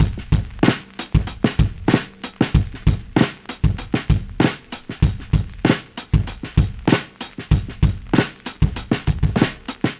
Music > Solo percussion
bb drum break loop shell 96
96BPM, Acoustic, Break, Breakbeat, Drum, DrumLoop, Drums, Drum-Set, Dusty, Lo-Fi, Vintage, Vinyl